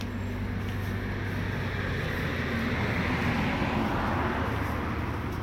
Sound effects > Vehicles
Car 2025-10-27 klo 20.13.01
Car, Field-recording, Finland